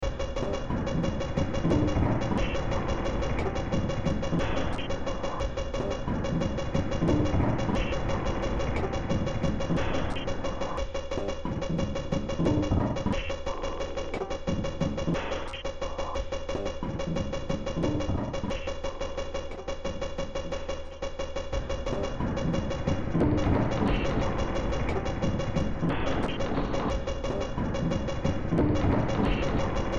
Music > Multiple instruments
Demo Track #3996 (Industraumatic)
Soundtrack, Industrial, Cyberpunk, Underground, Sci-fi, Horror, Ambient, Games, Noise